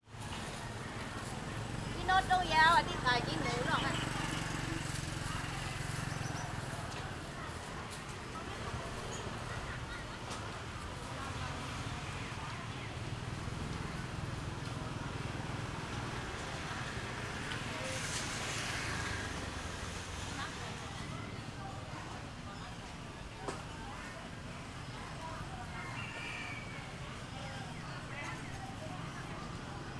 Soundscapes > Urban
Pai Walking Street Market – Endless Waves of Motorbikes
Recorded at Pai Walking Street market, Thailand, around 5:30 PM. Numerous motorbikes continuously arrive to meet vendors for deliveries, forming an endless flow through the street. Within this dense traffic, voices of people working, rustling plastic bags as goods are packed, and occasional bird calls reveal the everyday rhythm of life inside the chaos.
motorbikes, market, field-recording